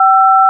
Sound effects > Electronic / Design
This is the number 5 in DTMF This is also apart of the pack 'DTMF tones 0-9'
dtmf, retro, telephone